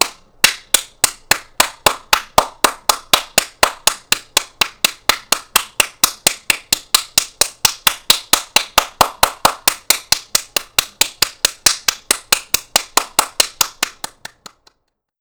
Sound effects > Human sounds and actions
A single person applauding or clapping.